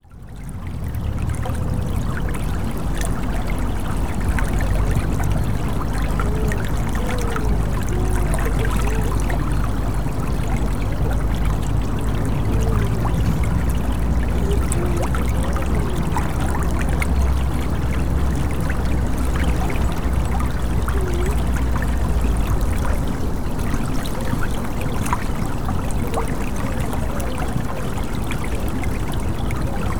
Soundscapes > Nature
A recording of water flowing through a stream in a park.
ambience, field, flowing, Re, recording, stream, water